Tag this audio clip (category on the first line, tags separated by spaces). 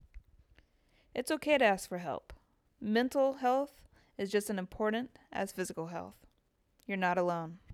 Speech > Solo speech
EndTheStigma MentalHealthMatters PSA SelfCare SupportEachOther Wellness YouAreNotAlone